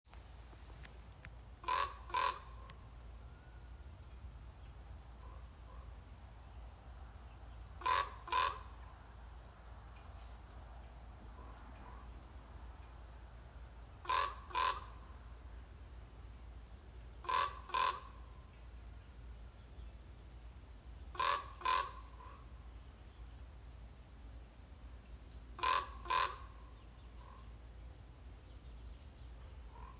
Soundscapes > Nature
Ravens talking to each other